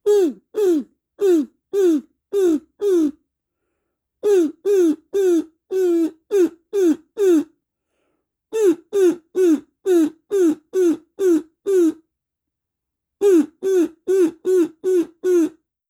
Sound effects > Animals
TOONAnml-Samsung Galaxy Smartphone, CU Kazoo, Imitation, Crow Call Nicholas Judy TDC
A kazoo imitating a crow calling.
call, crow, Phone-recording